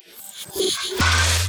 Sound effects > Experimental
Gritch Glitch snippets FX PERKZ-010
hiphop, pop, alien, glitch, crack, fx, snap, lazer, impacts, experimental, zap, laser, glitchy, otherworldy, impact, abstract, whizz, clap, perc, sfx, percussion, edm, idm